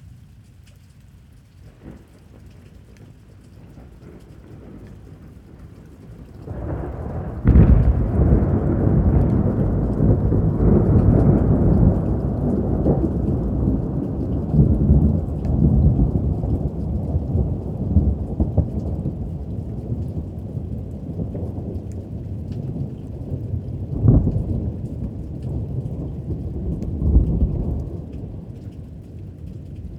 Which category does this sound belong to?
Sound effects > Natural elements and explosions